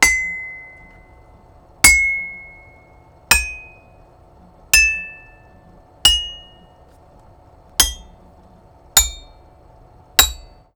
Music > Solo percussion
Toy xylophone notes.